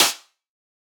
Instrument samples > Percussion
snare snappy
snare, edm, drum